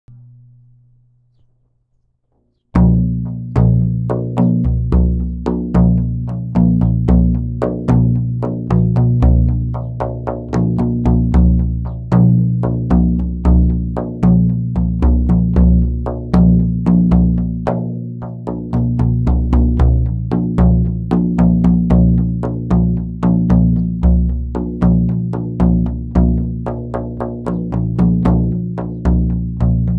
Music > Solo percussion
Solo-percussion with frame-drum. Instrument - frame-drum by Schlagwerk was recorded on my phone - Pixel 6pro